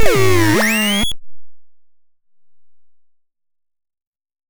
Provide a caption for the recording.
Sound effects > Electronic / Design
Optical Theremin 6 Osc dry-089
Alien, Analog, Bass, Digital, DIY, Dub, Electro, Electronic, Experimental, FX, Glitch, Glitchy, Handmadeelectronic, Infiltrator, Instrument, Noise, noisey, Optical, Otherworldly, Robot, Robotic, Sci-fi, Scifi, SFX, Spacey, Sweep, Synth, Theremin, Theremins, Trippy